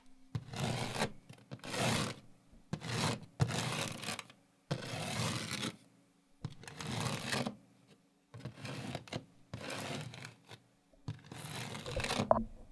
Sound effects > Human sounds and actions
Clawing or scratching at wood wall. Used for a monster trying to get through a wall.
casket, Clawing, monster, scratching, wood